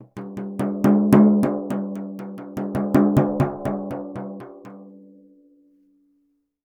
Music > Solo instrument
Tom Tension Pitch Change Tap Perc-002
Crash, Drums, Drum, Hat, Kit, Perc, Oneshot, Cymbals, Ride, Metal, Custom, Cymbal, Paiste, Percussion, GONG, Sabian, FX